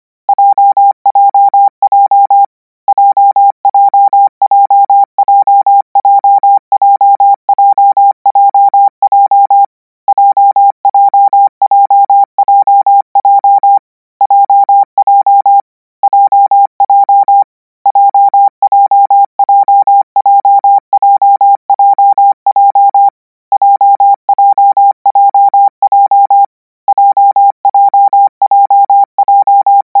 Sound effects > Electronic / Design
Koch 15 J - 200 N 25WPM 800Hz 90%
Practice hear letter 'J' use Koch method (practice each letter, symbol, letter separate than combine), 200 word random length, 25 word/minute, 800 Hz, 90% volume.